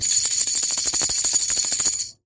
Music > Solo percussion
MUSCShake-Samsung Galaxy Smartphone, CU Tambourine, Short Nicholas Judy TDC
A short tambourine shake.